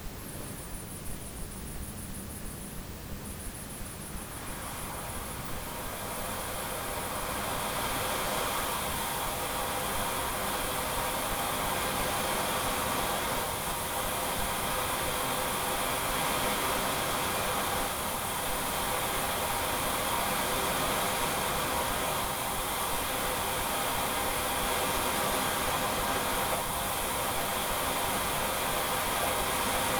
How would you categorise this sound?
Sound effects > Other mechanisms, engines, machines